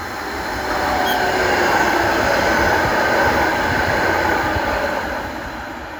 Soundscapes > Urban
A Tram driving by at high speed in Hervanta/Hallila, Tampere. Some car traffic or wind may be heard in the background. The sound was recorded using a Samsung Galaxy A25 phone